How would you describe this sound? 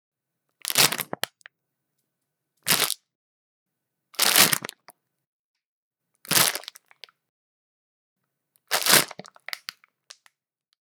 Sound effects > Other
FOODEat Cinematis RandomFoleyVol2 CrunchyBites Food.Bag Bruschetta Open Several Freebie
bag, bite, bites, bruschetta, crunch, crunchy, design, effects, foley, food, handling, plastic, postproduction, recording, rustle, SFX, snack, sound, texture